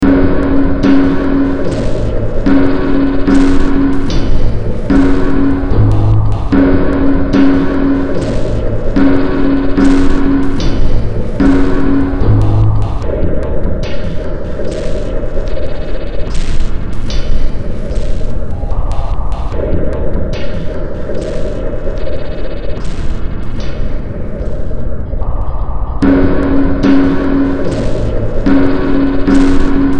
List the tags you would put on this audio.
Music > Multiple instruments
Sci-fi
Ambient
Noise
Horror
Cyberpunk
Underground
Industrial
Soundtrack
Games

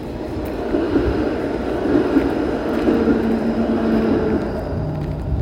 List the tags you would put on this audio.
Soundscapes > Urban
tampere
vehicle

